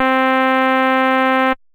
Instrument samples > Synths / Electronic
03. FM-X ALL2 SKIRT6 C3root
Montage, FM-X, Yamaha, MODX